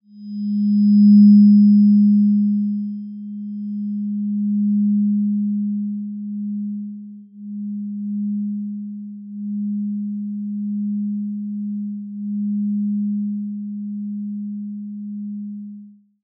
Sound effects > Objects / House appliances
Pipe Hit 4 Tone
Hitting a large hollow metal pipe, recorded with an AKG C414 XLII microphone.
metal, pipe, resonant, tone